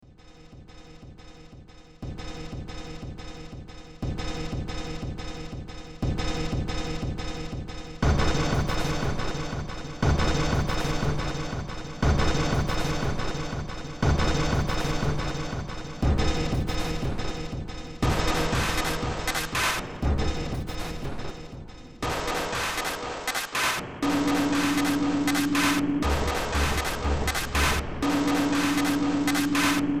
Music > Multiple instruments
Demo Track #3957 (Industraumatic)
Underground, Industrial, Sci-fi, Cyberpunk, Soundtrack, Games, Noise, Ambient, Horror